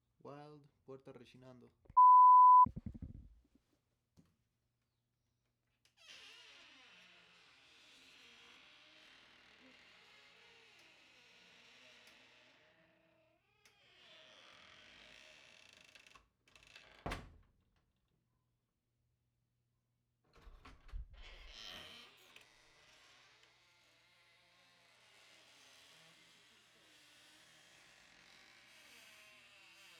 Sound effects > Objects / House appliances
Door squeaking / creaking
An old wooden door from a friend's house. It squeaks a lot when opening/closing. Recorded using a Zoom H4Essential with its native mic.